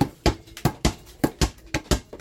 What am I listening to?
Sound effects > Objects / House appliances
SPRTCourt-Samsung Galaxy Smartphone, CU Basketball, Dribble Nicholas Judy TDC
Someone dribbling a basketball. Recorded at Goodwill.